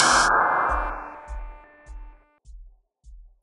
Sound effects > Electronic / Design
Impact Percs with Bass and fx-018
fx, smash, foreboding, theatrical, explode, cinamatic, sfx, bash, explosion, low, combination, looming, hit, bass, impact, oneshot, percussion, ominous, mulit, deep, crunch, perc, brooding